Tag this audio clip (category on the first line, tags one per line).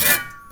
Sound effects > Other mechanisms, engines, machines
foley
fx
handsaw
hit
household
metal
metallic
perc
percussion
plank
saw
sfx
shop
smack
tool
twang
twangy
vibe
vibration